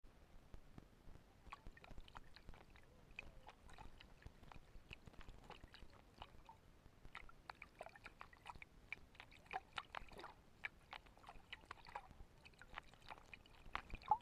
Soundscapes > Nature
STeDe Golena San Massimo point 6, Adrian, Andrea, Luana & Victoria
Underwater sound taken using Zoom H1 recording device in the Canale Piovego, Padova.
STeDe, Hydrography, naturesounds